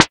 Instrument samples > Synths / Electronic
SLAPMETAL 8 Eb
additive-synthesis, bass, fm-synthesis